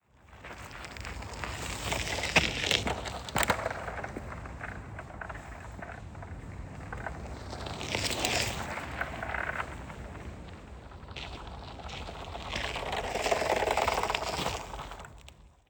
Sound effects > Vehicles
Bicycles pass 2 HZA
iPhone 16 stereo recording of a bicycle passing.
pedaling, bike, bicycle, cycle